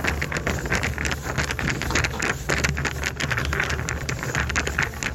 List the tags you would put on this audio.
Sound effects > Objects / House appliances
balls
moving

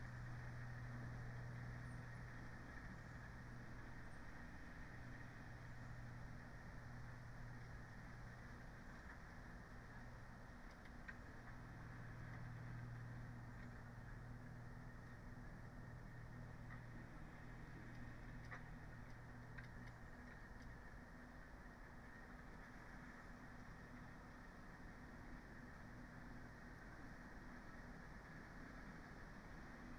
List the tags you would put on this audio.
Soundscapes > Nature
raspberry-pi
artistic-intervention
weather-data
sound-installation
nature
field-recording
data-to-sound
phenological-recording
Dendrophone
modified-soundscape
alice-holt-forest
soundscape
natural-soundscape

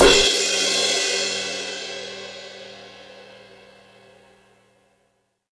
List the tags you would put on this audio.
Instrument samples > Percussion
polycrash
metallic
spock
crash
cymbal
bang
Zultan
Avedis
multicrash
Stagg
multi-China
Istanbul
sinocymbal
sinocrash
low-pitched

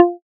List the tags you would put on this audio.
Instrument samples > Synths / Electronic
additive-synthesis
fm-synthesis
pluck

